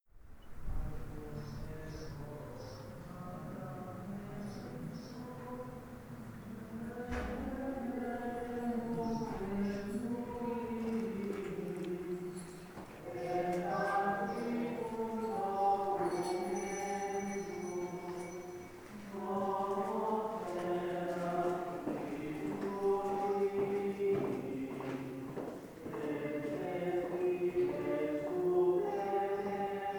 Soundscapes > Other
007A 091116 0435-2 SP Procession at Santuario del Saliente
Procession at Santuario del Saliente. Recorded in June 2025 with a Yamaha pocketrak c24. Fade in/out applied in Audacity. Please note that this audio file has been kindly recorded by Dominique LUCE, who is a photographer.
holy, ladiessong, Nuestra-Senora-del-Saliente, mass, field-recording, Catholic, women, religion, prayer, sanctuary, voice, procession, atmosphere, ambience, male, soundscape, believers, priest, religious, church, female, singing, Spain, sparrows, chanting, praying, men